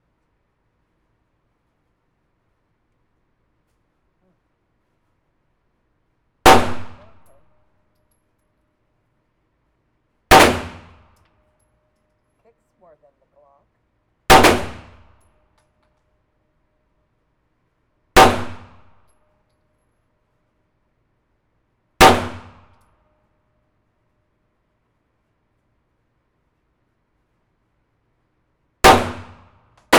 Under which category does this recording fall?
Sound effects > Other